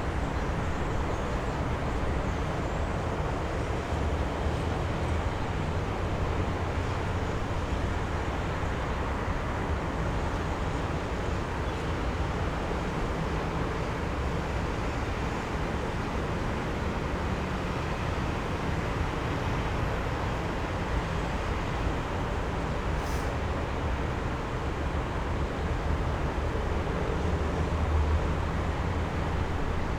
Soundscapes > Urban
AMBTraf-Zh6 Skyline, afternoon, highway, avenue, cars pass by, city rumble FILI URPRU
Ambiência. Skyline, tarde, avenida, carros passando, ruído da cidade, motos, urbano. Gravado no Aleixo, Manaus, Amazonas, Amazônia, Brasil. Gravação parte da Sonoteca Uirapuru. Em stereo, gravado com Zoom H6. // Sonoteca Uirapuru Ao utilizar o arquivo, fazer referência à Sonoteca Uirapuru Autora: Beatriz Filizola Ano: 2025 Apoio: UFF, CNPq. -- Ambience. Skyline, afternoon, highway, avenue, cars pass by, motorcycles, city rumble. Recorded at Aleixo, Manaus, Amazonas, Amazônia, Brazil. This recording is part of Sonoteca Uirapuru. Stereo, recorded with the Zoom H6. // Sonoteca Uirapuru When using this file, make sure to reference Sonoteca Uirapuru Author: Beatriz Filizola Year: 2025 This project is supported by UFF and CNPq.
vehicles, honk, car, bus, birds, field-recording, brazil, ambience, rumble, city, soundscape, general-noise, traffic